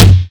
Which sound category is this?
Instrument samples > Percussion